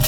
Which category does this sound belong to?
Sound effects > Objects / House appliances